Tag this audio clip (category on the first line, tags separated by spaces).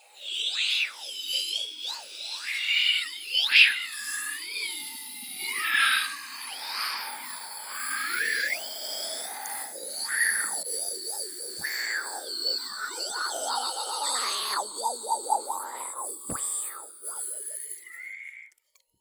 Sound effects > Objects / House appliances
perc stab foundobject hit oneshot mechanical clunk industrial percussion glass drill natural fx object bonk fieldrecording sfx metal foley